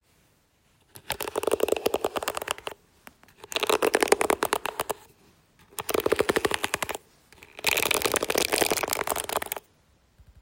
Sound effects > Objects / House appliances
ķemme / haircomb
closeup, item